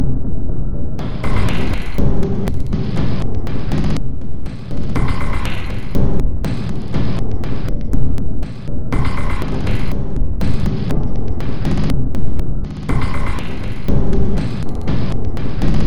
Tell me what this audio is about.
Instrument samples > Percussion
This 121bpm Drum Loop is good for composing Industrial/Electronic/Ambient songs or using as soundtrack to a sci-fi/suspense/horror indie game or short film.
Soundtrack, Underground, Weird, Dark, Samples, Drum, Loop, Ambient, Alien, Loopable, Packs, Industrial